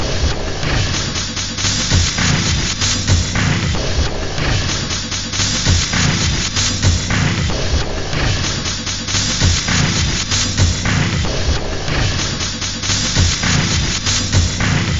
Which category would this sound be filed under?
Instrument samples > Percussion